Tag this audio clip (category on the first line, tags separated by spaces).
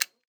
Sound effects > Human sounds and actions
click interface switch toggle off button activation